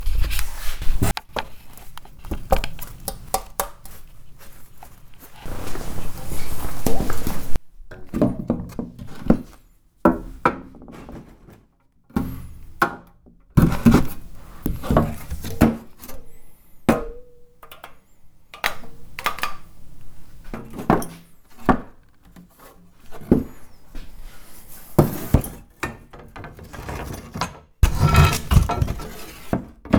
Sound effects > Experimental
clicks, knocks, twangs, scrapes, taps, metal, wood ~ foley sequence
A sequence of random hits, scrapes, knocks, oneshots, taps, fx twangs on both metallic and wood surfaces. Recorded with a Tascam DR-05 field recorder in my tool shop in Arcata, CA. The sounds were then processed lightly in Reaper to make a random sequence. Enjoy~
click, clicks, drum, foley, fx, garbage, hit, impact, kit, knock, knocks, metal, metallic, misc, noise, perc, percs, percussion, percussive, scrapes, sfx, sound, sticks, tap, taps, trash, twang, vibration, wood, wooden